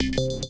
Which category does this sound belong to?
Sound effects > Electronic / Design